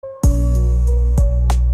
Music > Other
This is a small clip of a song that I created using Suno AI